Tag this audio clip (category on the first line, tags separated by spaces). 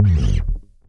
Music > Solo instrument
bass bassline basslines blues chords chuny electric electricbass funk fuzz harmonic harmonics low lowend note notes pick pluck riff riffs rock slap slide slides